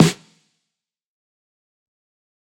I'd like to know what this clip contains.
Instrument samples > Percussion
Snare - hard
electronic, drum, perc, percussion, lofi, distorted, lo-fi, crunchy, hit